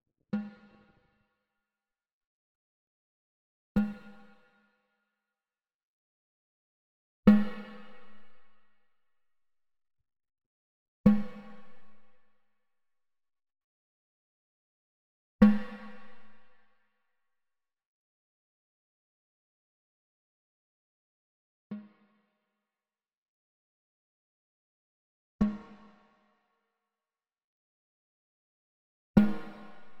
Music > Solo percussion
snare Processed - drum- snare off rising velocity sequence 1 - 14 by 6.5 inch Brass Ludwig
acoustic, beat, brass, crack, drum, drumkit, drums, flam, fx, hit, hits, kit, ludwig, oneshot, perc, percussion, processed, realdrum, realdrums, reverb, rim, rimshot, rimshots, roll, sfx, snare, snaredrum, snareroll, snares